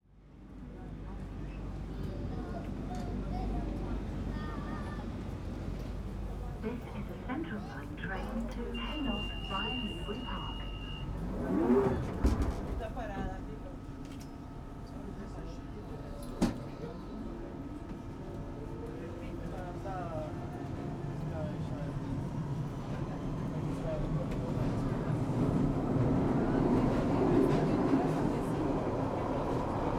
Soundscapes > Indoors
A recording at a London underground station.